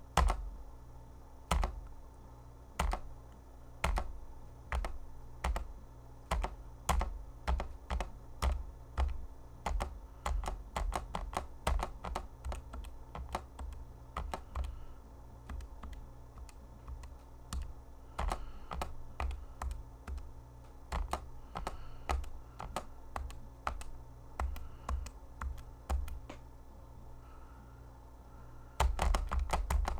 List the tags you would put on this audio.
Sound effects > Objects / House appliances
Blue-brand Blue-Snowball calculator foley

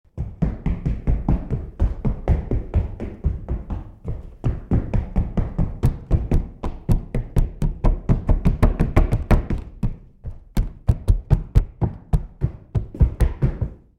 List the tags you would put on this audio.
Sound effects > Human sounds and actions
floor steps footsteps

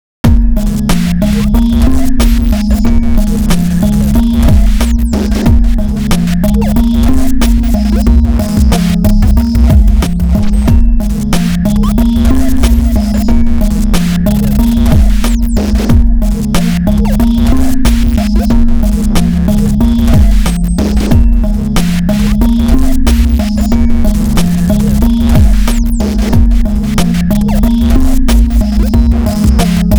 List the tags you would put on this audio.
Music > Multiple instruments
bass beats drumloop edm glitchy hip hop idm industrial loops melodies melody new patterns percussion wave

